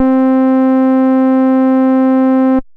Instrument samples > Synths / Electronic
02. FM-X ALL 1 SKIRT 4 C3root
Yamaha FM-X engine Waveform
FM-X, MODX, Montage, Yamaha